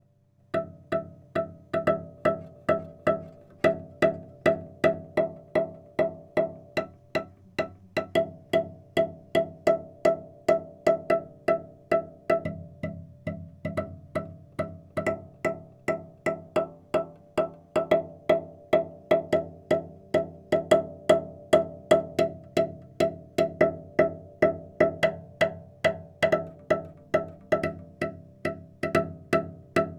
Sound effects > Experimental
Kiki / kiki
This sound has been edited and processed from the original recording.
cello, pizz, edited